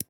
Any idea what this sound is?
Sound effects > Other
Small organic pop
Potato having a bud snapped off by hand